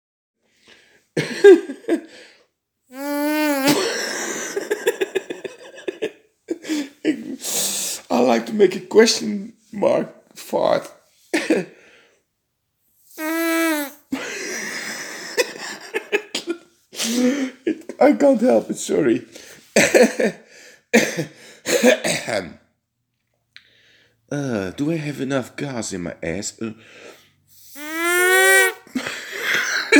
Sound effects > Human sounds and actions
fart question mark sound funny tried by an full UNprofessional
i looked for a questionmark fart-sound but i only found one... so i tried to create one by myself, i totally failed, but: i hope it inspires others to create a nice questionfart which i can use for one of my fun-vids in which two persons talk with eachother by farts... ... likewise as me and a friend did many years ago in a lunchroom ( only 3 words each, of which my friend by accident started with a questionsound so i "had to" reply which was easy because i started laughing so my guts started to rumble anyway.... ) so: a questionmarkfart ends with a higher tone... > can somebody help me ? yes: with this "?fart" ... ... i know ya advise mental help alsio ... ha ha haha ha ha ... ha...
humor, funny, trials, laugh, trial-attempts, flatulation, voice, unprofessional, laughing, trial, stupid, attempt, attempts, trying, weird, fart, questionmark, gas, question, virgin